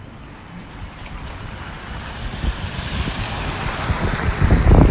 Sound effects > Vehicles
Bus coming in towards the microphone, Recorded with iphone-8 microphone
BUS, tires